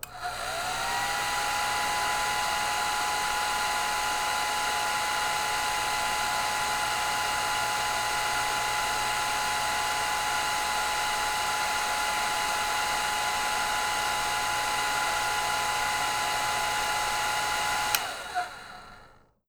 Sound effects > Objects / House appliances
MACHAppl-Blue Snowball Microphone Hair Dryer, Turn On, Run, Turn Off, Low Nicholas Judy TDC
A hair dryer turning on, running at low and turning off.